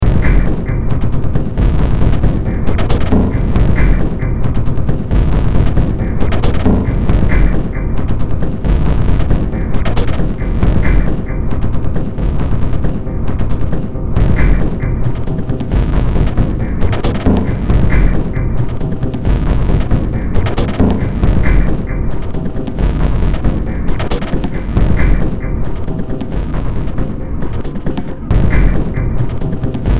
Music > Multiple instruments
Short Track #3951 (Industraumatic)
Soundtrack, Sci-fi, Industrial, Noise, Ambient, Cyberpunk, Horror, Underground, Games